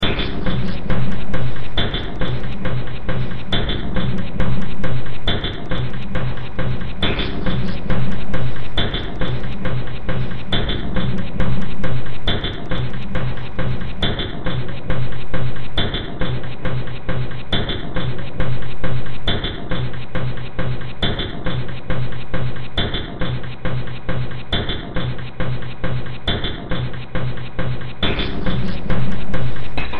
Music > Multiple instruments
Demo Track #3878 (Industraumatic)
Cyberpunk, Noise, Ambient, Games, Industrial, Soundtrack, Horror, Sci-fi, Underground